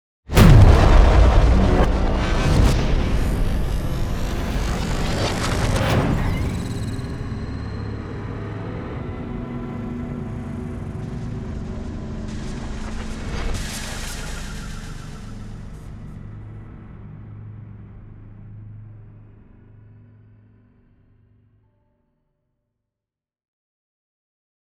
Sound effects > Other
Sound Design Elements Impact SFX PS 066
blunt, cinematic, collision, crash, design, effects, explosion, force, game, hard, heavy, hit, impact, power, rumble, sfx, sharp, shockwave, smash, thudbang